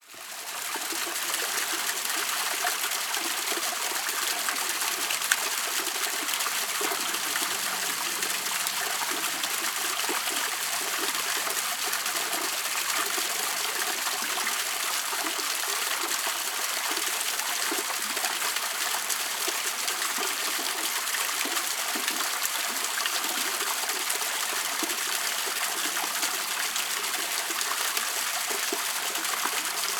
Soundscapes > Nature
Medium Stream Reverb
drops, stream, river